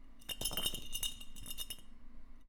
Sound effects > Objects / House appliances
A glass bottle rolling on a concrete floor (in the recycling room). Recorded with a Zoom H1.